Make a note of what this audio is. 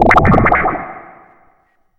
Instrument samples > Synths / Electronic
Benjolon 1 shot42
BENJOLIN, DRUM, SYNTH, 1SHOT, CHIRP, MODULAR, NOSIE